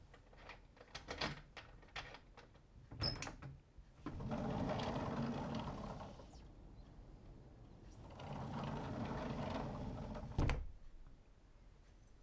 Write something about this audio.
Sound effects > Objects / House appliances
French doors unlock open close
French doors unlock, open and close. Recorded with Zoom H1.
opening, door, sliding, closing, french-door, open, unock, Dare2025-06A, close